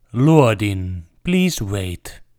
Speech > Solo speech
loading please wait
man, videogames, male, voice, calm